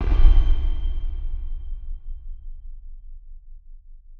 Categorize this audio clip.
Sound effects > Electronic / Design